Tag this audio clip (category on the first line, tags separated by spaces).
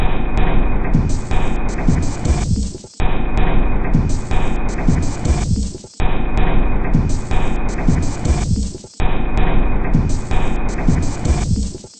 Instrument samples > Percussion
Alien Underground Ambient Loopable Dark Samples Weird Soundtrack Industrial Packs Loop Drum